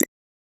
Sound effects > Objects / House appliances
Drips PipetteDripFast 3 SFX
Water being released from a cosmetic pipette into a small glass jar filled with water, recorded with a AKG C414 XLII microphone.